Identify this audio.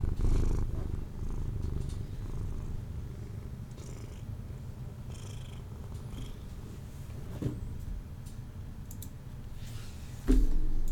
Sound effects > Animals
My cat purring, recorded on blue yeti mic